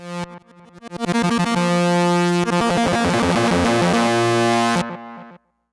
Sound effects > Experimental
Analog Bass, Sweeps, and FX-106
sample, effect, alien, sfx, electro, fx, electronic, robot, oneshot, basses, complex, analog, mechanical, trippy, bassy, dark, korg, sci-fi, scifi, retro, machine, snythesizer, vintage, bass, pad, weird, sweep, robotic, synth, analogue